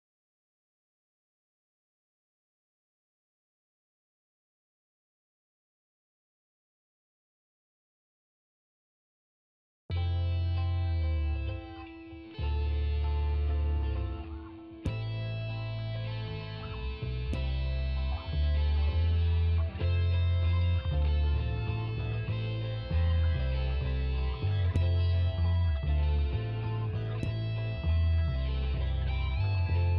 Music > Solo instrument
Guitars Fmaj7 97bpm
Some noise I cooked up. Some live instruments recorded through Headrush MX5, and other parts assembled in Logic Pro. Steal any stems. Or if you're trying to make music, hit me up! I'll actually make something of quality if you check out my other stuff.
chord, guitar, rhythym, riff, music, f, song, solo, bpm